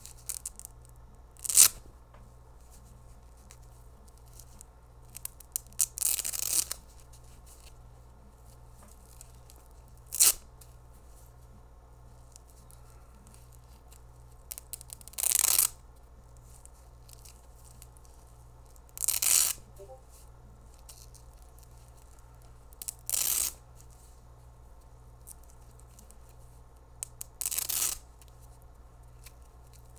Sound effects > Objects / House appliances
CLOTHRip-Blue Snowball Microphone Cap, Velcro, Rip Off, Put On Nicholas Judy TDC
Cap velcro being ripped off and putting on.
Blue-brand, Blue-Snowball